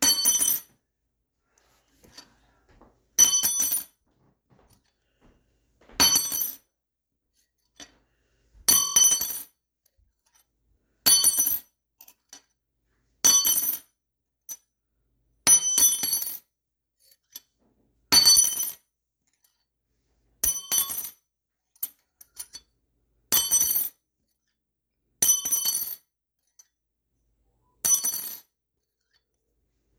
Sound effects > Objects / House appliances
METLImpt-Samsung Galaxy Smartphone, CU Wrench, Drop, On Tile Nicholas Judy TDC
Wrench drops on tile.
wrench, drop, tile, foley, Phone-recording